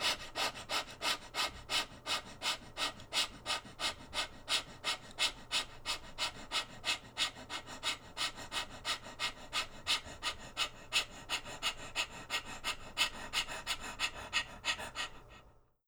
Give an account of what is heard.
Sound effects > Human sounds and actions
HMNBrth-Blue Snowball Microphone Panting, Medium Nicholas Judy TDC
Blue-brand, Blue-Snowball, breath, human, medium, pant